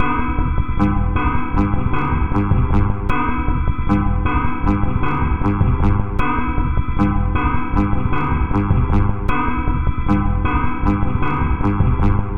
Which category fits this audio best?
Instrument samples > Percussion